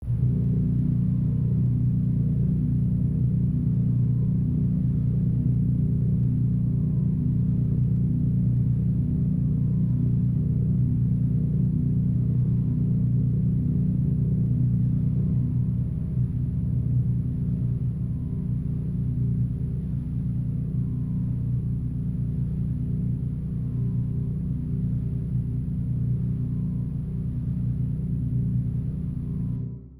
Instrument samples > Synths / Electronic
Multi-layered drone sound using some strings, samples, and effects.
ambient, atmosphere, atmospheric, design, designed, distort, drone, effect, layer, layered, pad, soundscape, space, string